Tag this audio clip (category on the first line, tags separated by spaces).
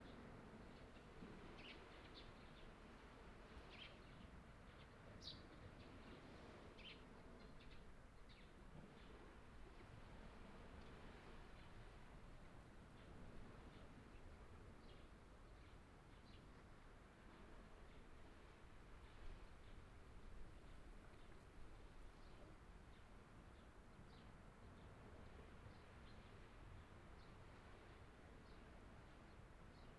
Soundscapes > Nature
Field-recording Beach water